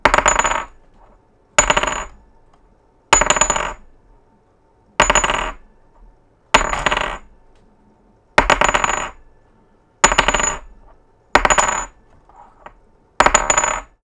Sound effects > Objects / House appliances
A shotglass being dropped. No break.